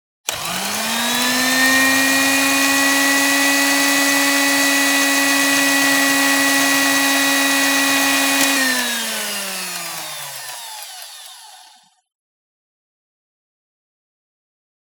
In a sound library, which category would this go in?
Sound effects > Objects / House appliances